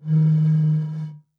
Sound effects > Human sounds and actions
Bottle Blow
sound of me blowing through the opening of an old cider bottle, recorded into adobe audition with a sure sm57 for a university project
breathe, bottle, glass